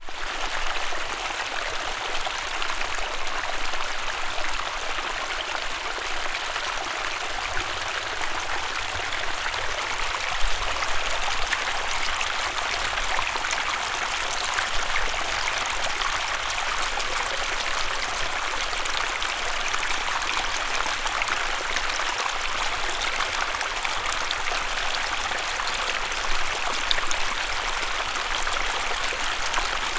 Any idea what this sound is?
Soundscapes > Nature
WATRFlow Water cascade down a rock fall
Steady flow of water cascading down a rock face in a suburban creek.
sfx, water, brook, stream, babbling, splash, dripping, creek, flow, field-recording, trickle, ambience, liquid, rock, drip